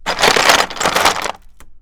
Sound effects > Objects / House appliances
ice cubes movement in tray2
tray, cubes, ice